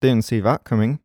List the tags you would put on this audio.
Speech > Solo speech
Tascam
MKE-600
2025
Calm
Shotgun-mic
Hypercardioid
Voice-acting
MKE600
VA

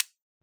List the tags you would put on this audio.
Sound effects > Other mechanisms, engines, machines
click
percusive
foley
recording
sampling

